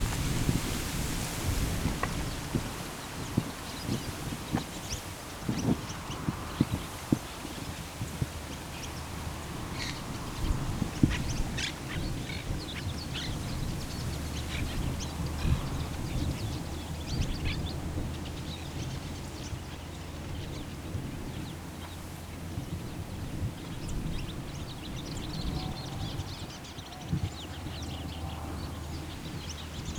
Soundscapes > Nature
countryside-day-breeze and birds 1
ambiance recorded in a farm near a route. Very few vehicles passing by.
ambiance, birds, breeze, countryside, sunny